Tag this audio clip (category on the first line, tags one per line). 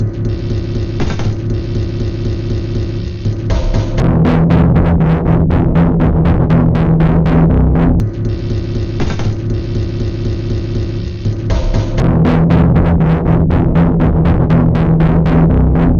Instrument samples > Percussion
Alien
Ambient
Dark
Drum
Industrial
Loop
Packs
Samples
Soundtrack
Underground